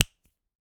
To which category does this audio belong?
Sound effects > Human sounds and actions